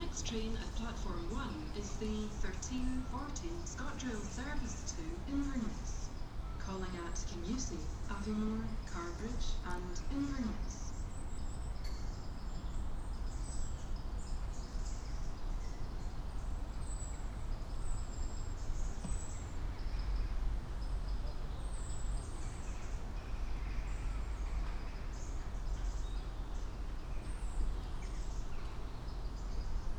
Soundscapes > Other
Pitlochry Railwaystation
Once again: the titel says it all: Pitlochry Station. The train from south going to Inverness. Just made it in time to start the recording. Sony PCM-M10 and them binaural Ohrwurm microphones and all that in autumn.
binaural, railway, railwaystation, train